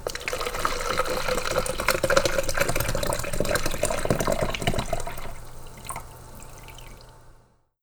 Sound effects > Natural elements and explosions
WATRPour Water Pouring, Into Cup Nicholas Judy TDC
Water pouring into plastic cup.
cup, Phone-recording, plastic, pour, water